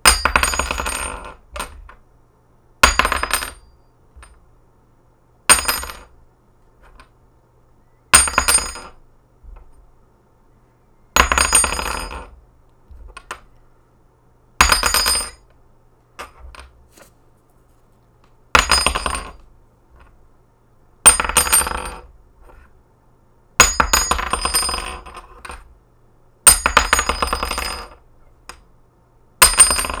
Sound effects > Objects / House appliances
OBJKey-Blue Snowball Microphone, CU Antique, Drop, Pick Up Nicholas Judy TDC
An antique key dropping and picking up.
foley, Blue-Snowball, Blue-brand, key, antique, pick-up, drop